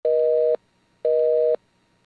Sound effects > Objects / House appliances
Busy signal on a phone
busy, electronic, phone, ringing, telephone, tone